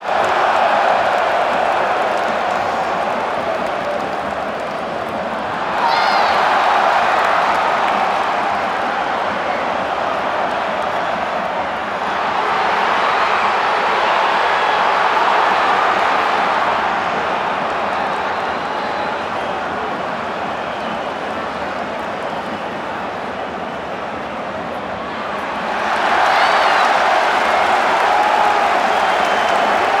Soundscapes > Other
CRWDReac-SOCCER Millerntor Stadium Crowd Reaction Mood Waves 01 PHILIPP FEIT FCSP 29.546 Sound Of Sankt Pauli
Authentic live recording from FC St. Pauli’s Millerntor Stadium, capturing the natural ebb and flow of 29,546 fans as they react to the unfolding match.
Crowd, Football, Millerntor, Mood, Reaction, SanktPauli, Soccer, Stadium